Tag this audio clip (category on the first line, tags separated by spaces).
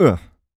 Speech > Solo speech
MKE-600 pain Male MKE600 Shotgun-microphone Single-mic-mono hurt uruh mid-20s Sennheiser Tascam Calm Adult Voice-acting VA 2025 FR-AV2 Generic-lines Hypercardioid Shotgun-mic july